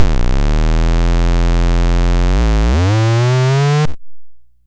Sound effects > Electronic / Design
Optical Theremin 6 Osc dry-007
Alien, Analog, Bass, Digital, DIY, Dub, Electro, Electronic, Experimental, FX, Glitch, Glitchy, Handmadeelectronic, Infiltrator, Instrument, Noise, noisey, Optical, Otherworldly, Robot, Robotic, Sci-fi, Scifi, SFX, Spacey, Sweep, Synth, Theremin, Theremins, Trippy